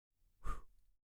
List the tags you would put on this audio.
Sound effects > Human sounds and actions
blow bubbles foley pomper short shot

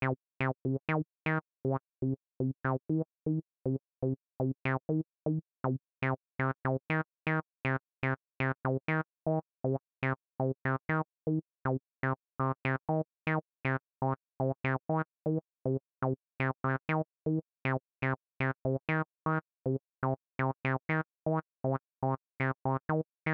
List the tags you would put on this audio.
Music > Solo instrument
303 Acid electronic hardware house Recording Roland synth TB-03 techno

